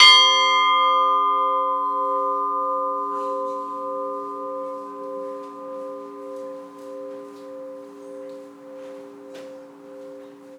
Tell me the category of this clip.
Instrument samples > Percussion